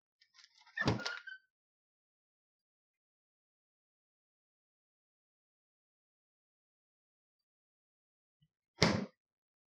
Sound effects > Objects / House appliances
Opened and closed the refrigerator
Recorded on your favorite Samsung Galaxy Grand Prime smartphone.
close, door, open, refrigerator